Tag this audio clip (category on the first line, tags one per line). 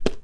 Sound effects > Objects / House appliances
carton
clack
click
foley
industrial
plastic